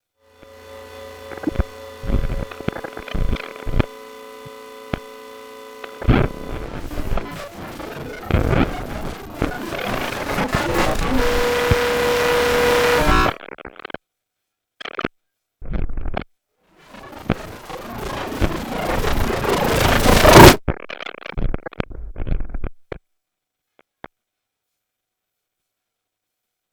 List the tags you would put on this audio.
Sound effects > Electronic / Design
wavelet
Ether
FM
anti-radio
crackle
SOMA
noise
EMF
surface
tactile
dust

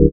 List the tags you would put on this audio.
Instrument samples > Synths / Electronic
additive-synthesis
fm-synthesis

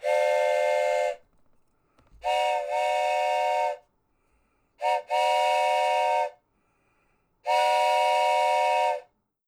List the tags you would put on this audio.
Sound effects > Objects / House appliances
Blue-brand
toot
train

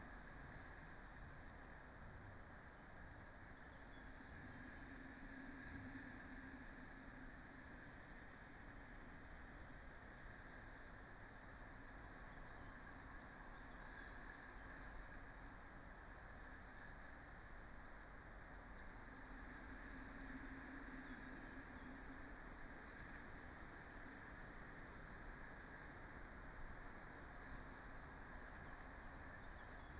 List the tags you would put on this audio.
Soundscapes > Nature
alice-holt-forest
artistic-intervention
data-to-sound
natural-soundscape
nature
phenological-recording
raspberry-pi
sound-installation
soundscape
weather-data

